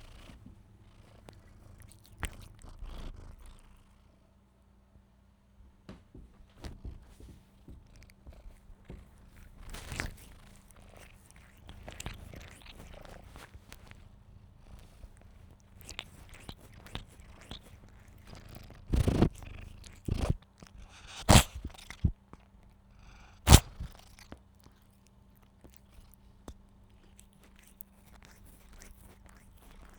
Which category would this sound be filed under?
Sound effects > Animals